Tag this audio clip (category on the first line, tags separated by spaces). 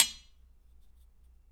Sound effects > Objects / House appliances
metal industrial perc drill glass object natural stab fx clunk hit sfx foley foundobject bonk fieldrecording mechanical percussion oneshot